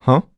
Speech > Solo speech
Confused - Huh
Human, Vocal, confused, singletake, Voice-acting, Mid-20s, dialogue, talk, oneshot, U67, NPC, FR-AV2, Male, voice, Single-take, Man, Neumann, Tascam, Video-game, sound